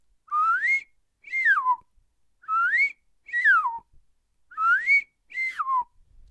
Sound effects > Human sounds and actions
A sound of me whistling up and down multiple times.
down, whistling